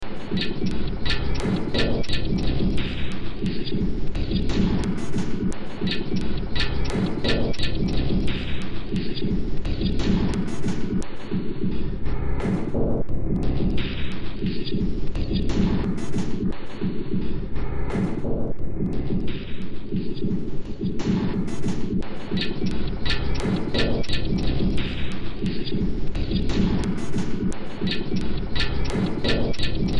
Music > Multiple instruments
Demo Track #3654 (Industraumatic)
Horror, Sci-fi, Cyberpunk, Games, Industrial, Soundtrack, Underground, Noise, Ambient